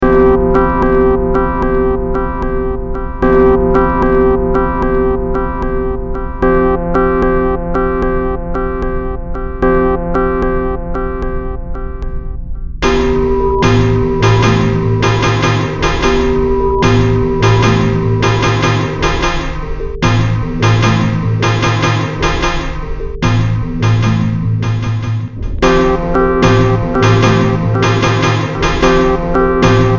Music > Multiple instruments
Short Track #3615 (Industraumatic)
Track taken from the Industraumatic Project.
Ambient, Cyberpunk, Games, Horror, Industrial, Noise, Sci-fi, Soundtrack, Underground